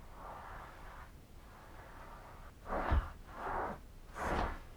Sound effects > Objects / House appliances
Shoe sole on bedside matt 2x slow 3x fast
Rubbing the sole of my foot on the soft bedside matt while wearing slippers. 2x slow foot movement and then 3x fast foot movement. The sound was very faint, especially for the slow movements, and I had to boost the volume quite a bit and use noise reduction in Audacity. Recorded with Zoom H1.
Dare2025-08, foot, friction, matt, rubbing, shoe, soft, sole